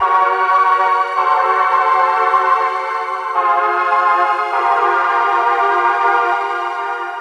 Music > Solo instrument
133 G# KorgPoly800StringsWash Loop 01
Synth Melody made using Korg Poly-800 analog synth
Synth, SynthPad